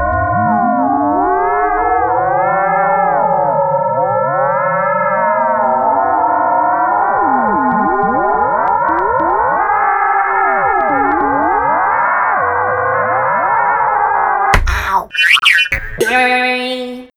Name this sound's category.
Sound effects > Electronic / Design